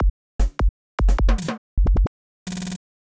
Sound effects > Electronic / Design
drum fill sound created by me
beat, drum, drums, fill